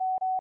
Sound effects > Electronic / Design
Acknowledge notification sound
Made using a generated dial tone sound in ocenaudio and adjusting the pitch and speed of the sound. Used in my visual novel: R(e)Born_ Referenced with AKG K240.
blip, computer